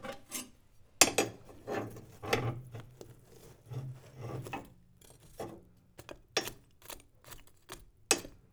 Sound effects > Objects / House appliances
Stirring up the insides of a fireplace with a metal rod A-B
Subject : Moving the ash of a fireplace with a metal rod. Date YMD : 2025 04 19 Location : Gergueil France. Hardware : Tascam FR-AV2, Rode NT5 A-B Weather : Processing : Trimmed and Normalized in Audacity.